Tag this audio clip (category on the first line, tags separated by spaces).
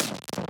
Sound effects > Experimental
snap otherworldy alien idm crack perc impact glitch percussion whizz impacts glitchy hiphop experimental zap abstract lazer clap pop fx laser edm sfx